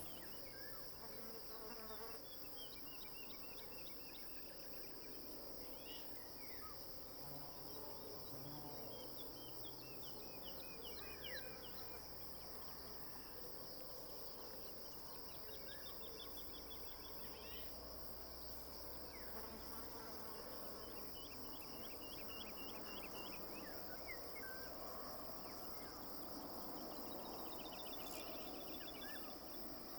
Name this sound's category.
Soundscapes > Nature